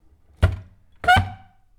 Sound effects > Objects / House appliances
Rolling Drawer 07
drawer, open, dresser